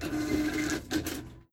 Sound effects > Objects / House appliances
MACHOffc-CU CVS-Register Receipt Printout Nicholas Judy TDC
A register receipt printout. Recorded at CVS Pharmacy.
printout, receipt, register